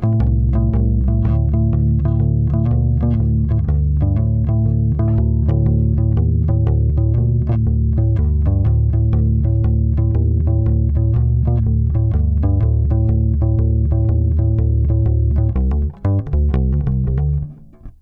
Music > Solo instrument
mello blues rock riff
chords, electric, riffs, basslines, funk, low, harmonics, bassline, slide, fuzz, blues, riff, chuny, rock, harmonic, bass, pick, lowend, note, slap, notes, pluck, electricbass, slides